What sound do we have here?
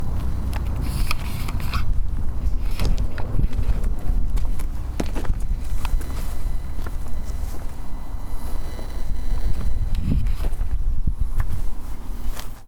Sound effects > Objects / House appliances
Junkyard Foley and FX Percs (Metal, Clanks, Scrapes, Bangs, Scrap, and Machines) 179
Robotic, garbage, SFX, Metallic, Clank, dumping, FX, Perc, scrape, Junkyard, Machine, Metal, dumpster, Bash, Atmosphere, waste, Ambience, Foley, trash, Bang, Clang, Environment, Junk, tube, Percussion, rubbish, Smash, Robot, rattle, Dump